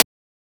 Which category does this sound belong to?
Instrument samples > Percussion